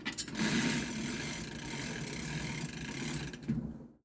Sound effects > Other mechanisms, engines, machines
CLOCKMech-Samsung Galaxy Smartphone, CU Grandfather Clock Winding Nicholas Judy TDC
A grandfather clock winding.